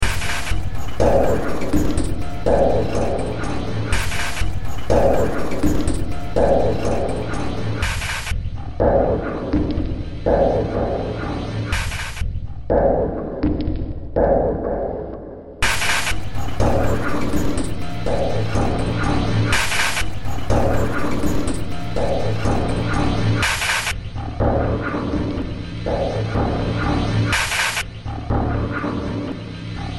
Music > Multiple instruments
Demo Track #3393 (Industraumatic)
Soundtrack, Sci-fi, Cyberpunk, Games, Noise, Underground, Industrial, Ambient, Horror